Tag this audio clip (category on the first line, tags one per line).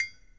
Instrument samples > Percussion
drum
percussion
analog
1lovewav
perc